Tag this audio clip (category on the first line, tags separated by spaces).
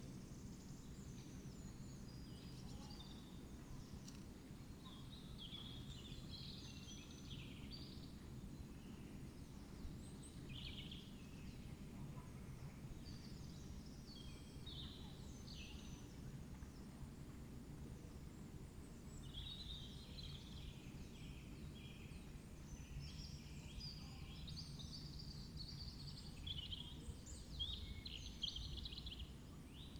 Soundscapes > Nature
soundscape weather-data raspberry-pi modified-soundscape data-to-sound nature field-recording natural-soundscape artistic-intervention phenological-recording alice-holt-forest sound-installation Dendrophone